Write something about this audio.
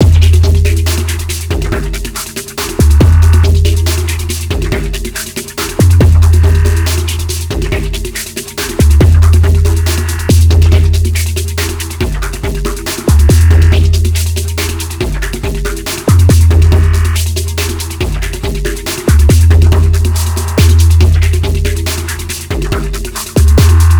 Music > Multiple instruments
bassbeat 140bpm
a rhythm loop i made late night while eating a bowl of cereal, FL Studio, Reaper
kit, glitchy, percussion-loop, electro, kitloop, grimey, industrial, loopable, percs, funky, groovy, drumnbass, hiphop, triphop, beat, rhythm, dance, drumloop, chill, dirty, drums, edm, bass, loop, drumloops, 140bpm, percloop, gritty